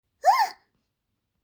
Speech > Solo speech
Major Effort and Fear
The yelp of a tiny girl
effort, female, jump, panic, small, tiny, voice, yelp